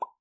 Sound effects > Human sounds and actions
A pop sound effect, created using a mouth. Used as a bubble effect, or pop-in sound effect in a video game. Quiet, pop of air. Recorded using Quadcast S USB Gaming Microphone. Post-processed for noise reduction.